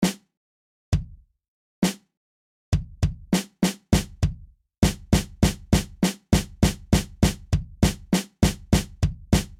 Music > Solo percussion
Drum Sounds
drum, drums, percussion